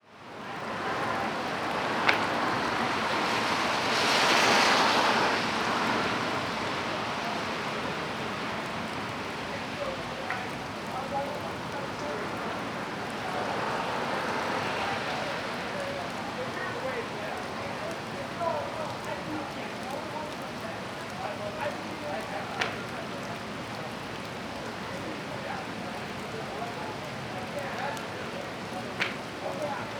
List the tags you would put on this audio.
Soundscapes > Urban
ambience
horn
night
outside
people
rain
talking
traffic
train
urban
wet

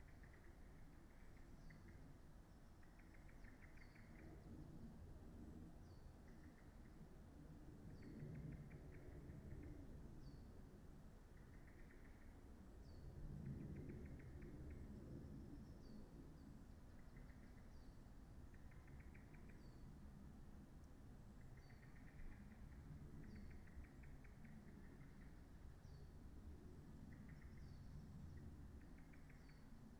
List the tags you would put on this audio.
Soundscapes > Nature
soundscape
field-recording
natural-soundscape
artistic-intervention
weather-data
modified-soundscape
nature
Dendrophone
raspberry-pi
alice-holt-forest
sound-installation
data-to-sound
phenological-recording

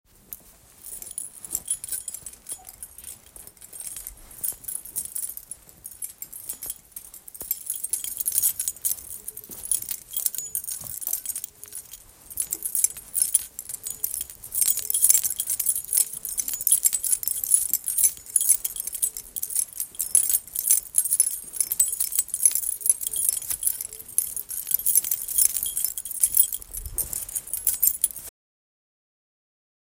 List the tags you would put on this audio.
Sound effects > Objects / House appliances
field-recording
indoors
keys

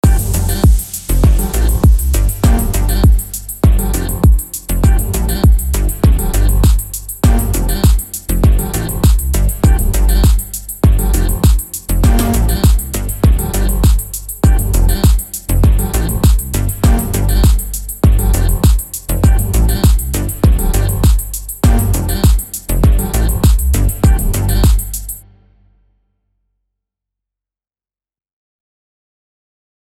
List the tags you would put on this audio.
Music > Multiple instruments
Bass
Composition
Drums
Free
Kick
Loop
Music
Musical

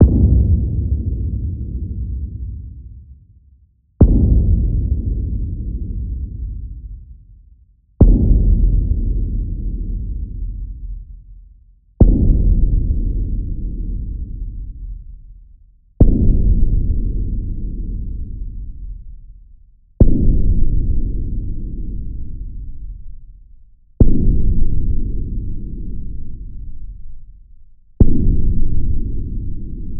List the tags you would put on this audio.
Sound effects > Electronic / Design
effect
boom
movie
electronic
soundeffect
sounddesign
game
synth
trailer

